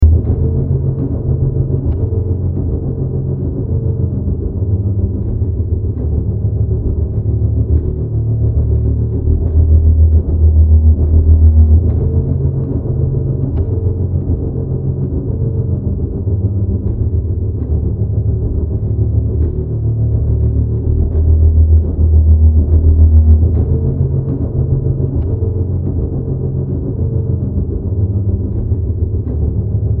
Soundscapes > Synthetic / Artificial
Looppelganger #192 | Dark Ambient Sound
Use this as background to some creepy or horror content.
Noise, Hill, Underground, Ambient, Games, Ambience, Survival, Weird, Silent, Horror, Soundtrack, Drone, Gothic, Sci-fi, Darkness